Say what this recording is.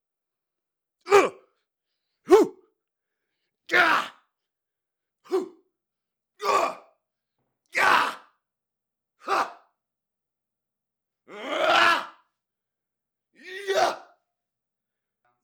Sound effects > Human sounds and actions
Henchman #3 Fight Vocalizations
Send us what you use it in! We'd love to see your work. Check it out here!
fighting, punch, thug